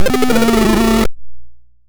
Sound effects > Electronic / Design
Optical Theremin 6 Osc dry-060
Alien, Analog, Bass, Digital, DIY, Dub, Electro, Electronic, Experimental, FX, Glitch, Glitchy, Handmadeelectronic, Infiltrator, Instrument, Noise, noisey, Optical, Otherworldly, Robot, Robotic, Sci-fi, Scifi, SFX, Spacey, Sweep, Synth, Theremin, Theremins, Trippy